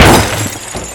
Instrument samples > Percussion
car crash long 1
accident, aliendrum, alienware, bang, break, breaking, broken, car, car-crash, carcrash, cinematic, collision, crash, death-metal, effect, engine, fender-bender, glass, motorway, pile-up, recording, road, smash-up, sound, weirddrum, wreck